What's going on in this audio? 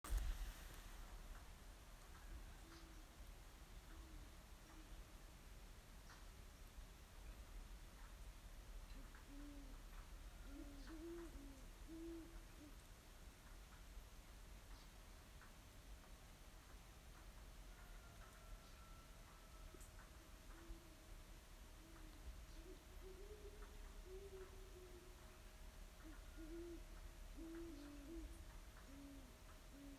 Soundscapes > Nature
Morning ambiance with drizzle , hawks and rooster 12/08/2021
Morning ambience with light rain and hawks
morning-ambience, country, hawks, rooster